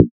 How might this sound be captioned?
Instrument samples > Synths / Electronic
DUCKPLUCK 8 Bb
additive-synthesis, bass, fm-synthesis